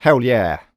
Speech > Solo speech
Joyful - Hell yeah
Mid-20s, U67, Neumann, FR-AV2, Single-take, singletake, oneshot, Voice-acting, dialogue, Male, voice, talk, excited, joyful, Tascam, NPC, Human, Vocal, happy, Video-game, joy, Man